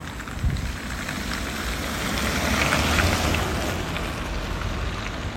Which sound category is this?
Sound effects > Vehicles